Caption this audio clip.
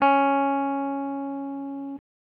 Instrument samples > String
Random guitar notes 001 CIS4 02
electric, electricguitar